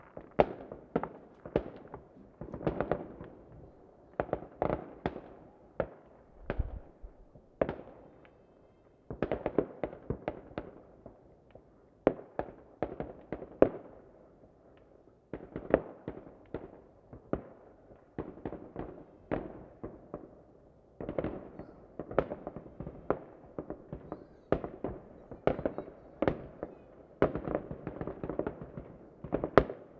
Soundscapes > Urban
01 fireworks at midnight
Big fireworks at midnight sharp, in the first minutes of 2026. In the background, small children can sometimes be heard getting excited about the event. Recorded with a zoom h5 recorder, using its own XY capsule.
field-recording, explosion, firecrackers